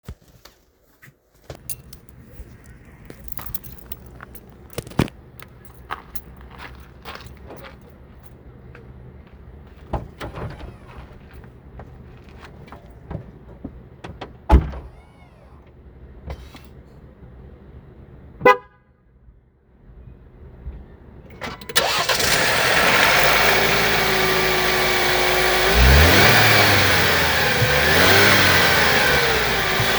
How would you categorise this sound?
Sound effects > Vehicles